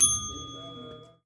Sound effects > Objects / House appliances
BELLHand-Samsung Galaxy Smartphone, CU Desk, Ring 05 Nicholas Judy TDC
A desk bell ring. Recorded at Five Bellow.
bell, desk, Phone-recording, ring